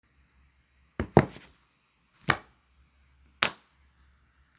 Sound effects > Objects / House appliances
Putting down book and pen v1
puttingdown, pencil, books, table